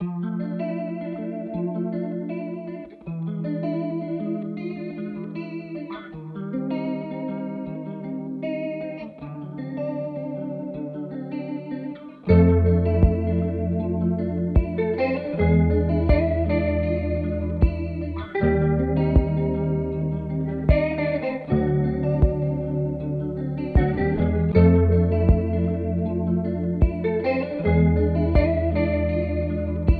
Music > Multiple instruments
A dark lonely guitar chord sequence including multiple guitar melodies, lost alone and afraid chord and simple drums. Gear used: Abelton Line 6 Helix Meris Enzo synth tc electronic flashback delay